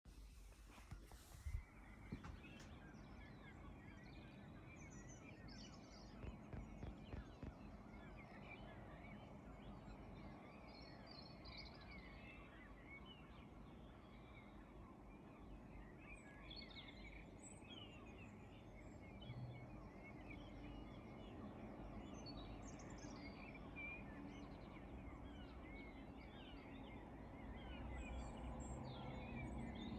Soundscapes > Nature
Morning bird chorus blackbird
Early morning birds
Birds, blackbird, chorus, early, morning, Robin